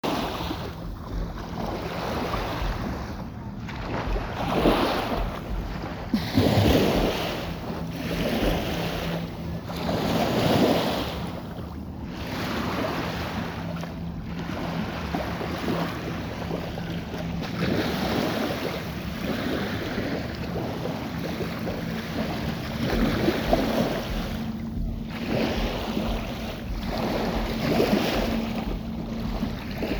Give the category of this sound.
Soundscapes > Nature